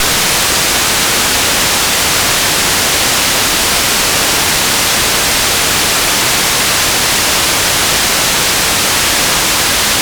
Instrument samples > Synths / Electronic
NOISE Oberheim Matrix1000
Noise Oscillator - Oberheim Matrix 1000
Oscillator, Oberheim, Matrix, Analog, Synthesizer, Matrix-1000, Noise